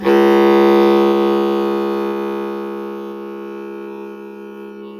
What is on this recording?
Instrument samples > Wind
Bass Clarinet Sustained Db2
Bass Clarinet Db2 (Written Eb3) You can freely use this. Recorded using laptop microphone
Clarinet, Wind